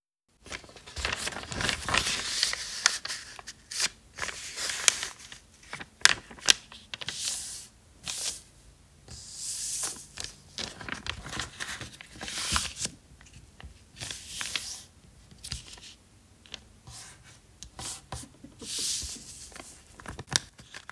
Sound effects > Objects / House appliances
Folding paper
Folding layers of paper
flatten, fold, paper